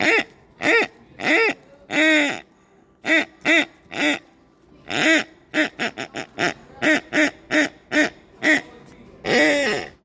Sound effects > Objects / House appliances
TOYMisc-Samsung Galaxy Smartphone, CU Squeeze, Squeaking 03 Nicholas Judy TDC
A squeeze toy squeaking. Recorded at Lowe's.
cartoon, Phone-recording, squeak, squeeze, toy